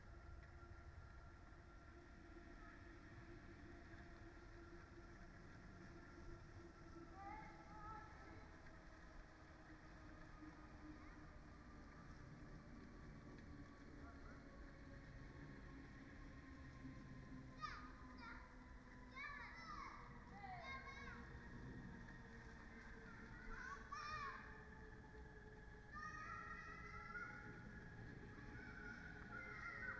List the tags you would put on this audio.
Soundscapes > Nature
artistic-intervention data-to-sound modified-soundscape natural-soundscape nature raspberry-pi

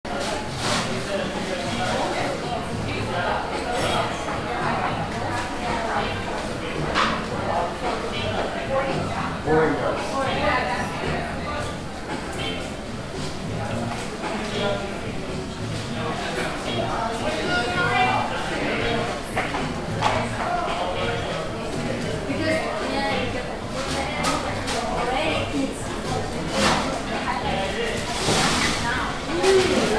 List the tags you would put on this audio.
Soundscapes > Indoors
ambience background cafe restaurant talking voices

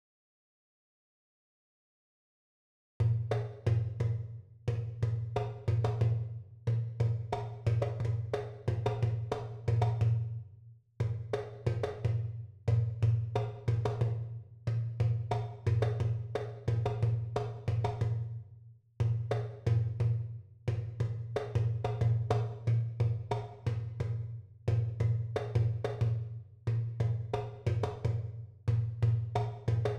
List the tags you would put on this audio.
Instrument samples > Percussion
colombia Juanero San

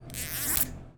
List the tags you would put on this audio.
Sound effects > Objects / House appliances
click zipper ziptie

